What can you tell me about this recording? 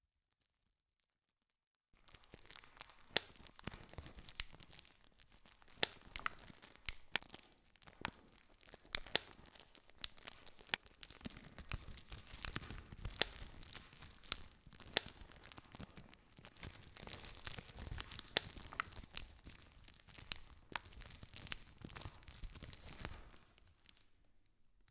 Sound effects > Objects / House appliances
Sound of a small fireplace/hearth. Fire just started in a not too big room. Created as foley for a podcast about a medieval story. Recorded by a Zoom H6 in a medium size room with lots of different kinds of paper and bubble plastic. Nice and cosy fire. Enjoy :-)